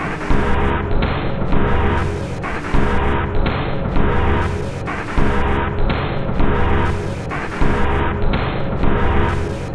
Instrument samples > Percussion
This 197bpm Drum Loop is good for composing Industrial/Electronic/Ambient songs or using as soundtrack to a sci-fi/suspense/horror indie game or short film.
Loopable, Packs, Samples, Industrial, Drum, Dark, Loop, Ambient, Weird, Alien, Soundtrack, Underground